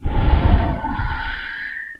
Sound effects > Electronic / Design
Tiger for Thriller movies; big cats